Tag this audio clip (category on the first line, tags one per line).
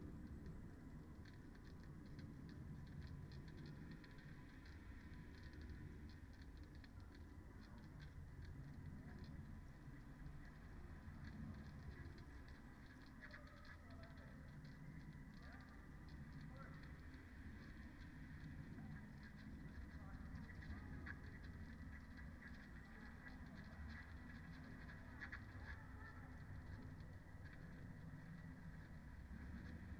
Soundscapes > Nature
artistic-intervention
data-to-sound
Dendrophone
natural-soundscape
nature
raspberry-pi
soundscape